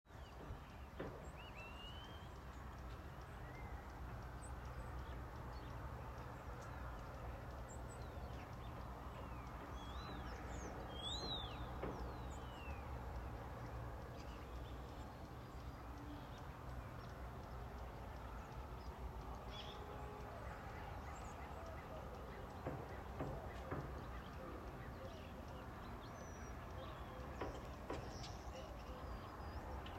Soundscapes > Nature
Morning ambience with some traffic and framing of thr new house on top of the hill 07/20/2023
coutryside, farm, morning